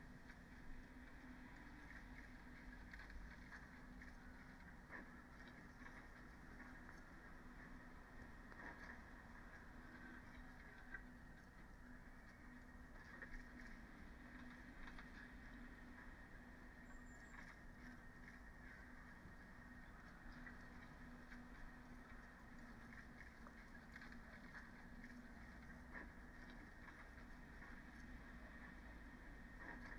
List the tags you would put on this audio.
Soundscapes > Nature
Dendrophone
data-to-sound
artistic-intervention
nature
alice-holt-forest
soundscape
weather-data
sound-installation
field-recording
phenological-recording
modified-soundscape
natural-soundscape
raspberry-pi

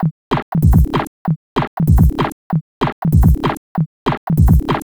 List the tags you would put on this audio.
Instrument samples > Percussion
Underground
Samples
Weird
Soundtrack
Alien
Drum
Loop
Loopable
Dark
Packs

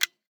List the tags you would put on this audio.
Sound effects > Human sounds and actions
click
activation
interface
toggle
switch
off
button

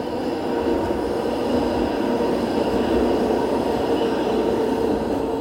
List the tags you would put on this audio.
Soundscapes > Urban
tram
vehicle
tampere

